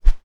Sound effects > Objects / House appliances
Whoosh - Plastic Hanger 2 (middle clip) 1
SFX, coat-hanger, swinging, Plastic, Transition, Whoosh, Hanger, Fast, swing, Airy, Rode, Tascam, NT5, FR-AV2